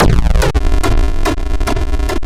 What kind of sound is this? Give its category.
Sound effects > Electronic / Design